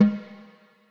Music > Solo percussion
Snare Processed - Oneshot 199 - 14 by 6.5 inch Brass Ludwig
drumkit, realdrums, oneshot, hit, fx, kit, realdrum, snares, reverb, snareroll, acoustic, crack, brass, ludwig, flam, beat, hits, rimshots, drum, rimshot, drums, snaredrum, sfx, roll, processed, perc, percussion, snare, rim